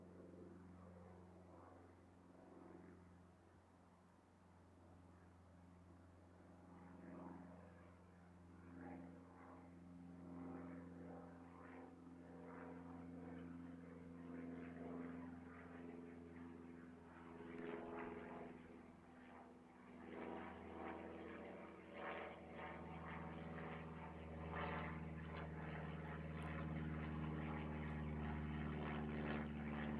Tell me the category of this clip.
Soundscapes > Other